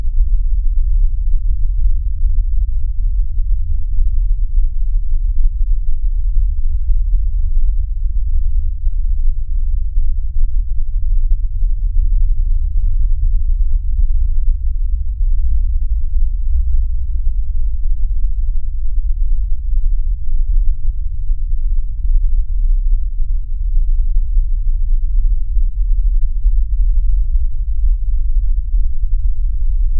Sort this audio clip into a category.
Sound effects > Experimental